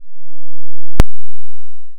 Instrument samples > Other
Preview is silent. See waveform :) Intended for recording IR samples
DC, Impulse, IR, Mathematics